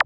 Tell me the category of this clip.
Sound effects > Electronic / Design